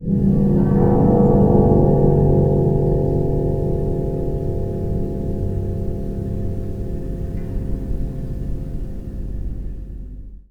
Soundscapes > Other
A homemade elecric aeolian harp set up during a storm in Midlothian Scotland. These are some of the highlights from a 12 hour recording the reflect the violence and strength of the storm we had. The harp was set up on the roof of a shed and bore the brunt of the storm.